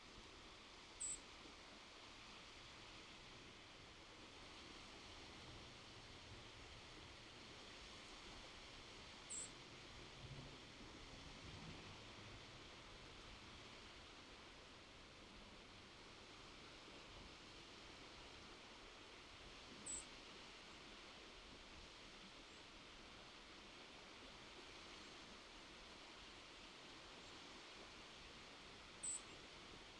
Soundscapes > Nature
White-throated sparrow / Bruant à gorge blanche Tascam DR-60 LOM Uši Pro (pair)
chirp, birds, bird, field-recording, nature